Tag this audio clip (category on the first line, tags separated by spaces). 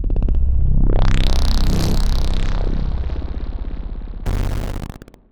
Instrument samples > Synths / Electronic
wobble sub wavetable synthbass bassdrop low subs lfo subwoofer lowend clear bass subbass stabs synth drops